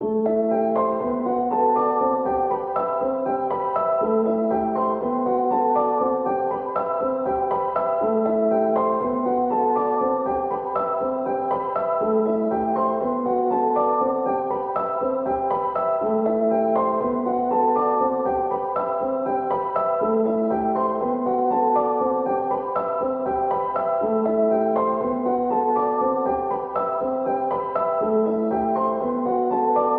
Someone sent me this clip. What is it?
Music > Solo instrument
Piano loops 081 efect 4 octave long loop 120 bpm
120, 120bpm, free, loop, music, piano, pianomusic, reverb, samples, simple, simplesamples